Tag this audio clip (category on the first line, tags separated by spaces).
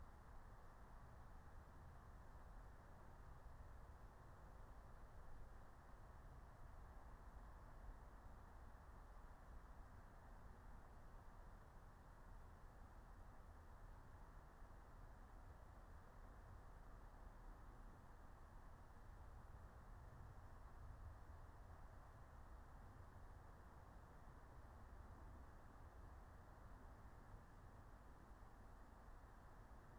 Soundscapes > Nature
alice-holt-forest nature raspberry-pi